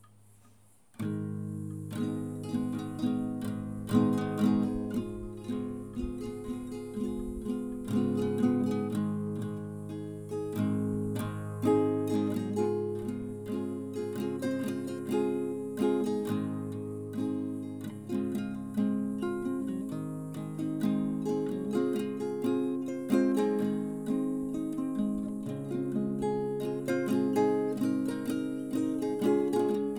Music > Solo instrument
a short, gentle little strummed loop on a nylon string guitar. background sound of cicadas.